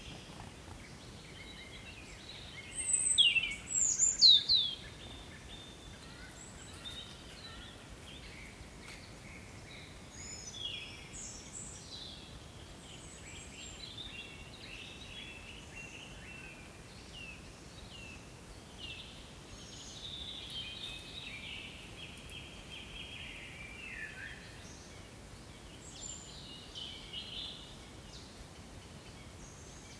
Soundscapes > Nature
20250418 21h00-00h00 - Gergueil forest chemin de boeuf
Subject : One of a few recordings from 10h37 on Friday 2025 04 18, to 03h00 the Saturday. Date YMD : 2025 04 18 Location : Gergueil France. "Chemin de boeuf". GPS = 47.23807497866109, 4.801344050359528 ish. Hardware : Zoom H2n MS mode (decoded in post) Added wind-cover. Weather : Half cloudy, little to no wind until late evening where a small breeze picked up. Processing : Trimmed and Normalized in Audacity.
Zoom-H2N, Cote-dor, France, Forest, forret, field-recording, 2025, nature, Rural, ambiance, birds, H2N, MS, country-side, ambience, Bourgogne-Franche-Comte, windless, Gergueil, spring, 21410, April, Mid-side